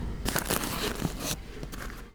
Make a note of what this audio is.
Sound effects > Objects / House appliances
Junkyard Foley and FX Percs (Metal, Clanks, Scrapes, Bangs, Scrap, and Machines) 43
Recording from the local Junkyard in Arcata, CA. Metallic bangs and clanks with machines running and some employees yelling in the distance. Garbage, Trash, dumping, and purposefully using various bits of metal to bop and clang eachother. Tubes, grates, bins, tanks etc.Recorded with my Tascam DR-05 Field Recorder and processed lightly with Reaper